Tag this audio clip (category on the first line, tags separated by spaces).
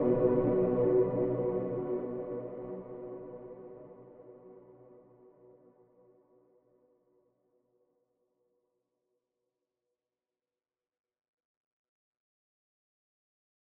Music > Other
DUNE3 FLSTUDIO AUDACITY